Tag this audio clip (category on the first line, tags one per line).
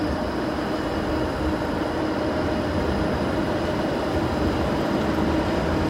Soundscapes > Urban
tram
vehicle